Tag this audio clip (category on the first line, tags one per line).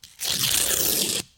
Sound effects > Objects / House appliances
rip
paper
tear